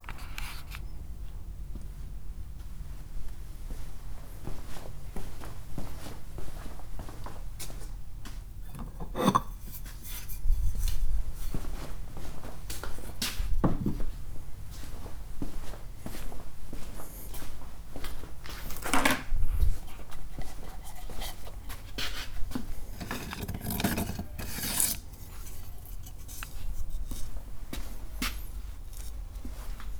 Sound effects > Other mechanisms, engines, machines
Woodshop Foley-001
sound, metal, fx, bop, little, crackle, tink, knock, boom, thud, foley, oneshot, sfx, percussion, perc, pop, wood, bam, tools, shop, bang, rustle, strike